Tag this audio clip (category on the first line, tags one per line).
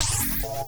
Sound effects > Electronic / Design
Interface
Digital
options
button
notification
menu
alert
UI
message